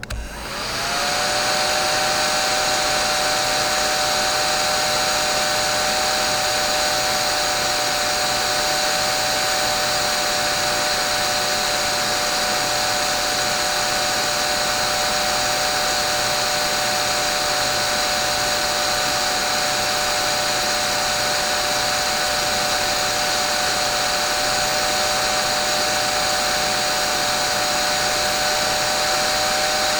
Sound effects > Objects / House appliances
MACHAppl-Blue Snowball Microphone, CU Mini Vacuum Cleaner, Turn On, Run, Turn Off Nicholas Judy TDC
A mini vacuum cleaner turning on, running and turning off.
turn-on, Blue-brand, vacuum, mini-vacuum-cleaner, Blue-Snowball, run, turn-off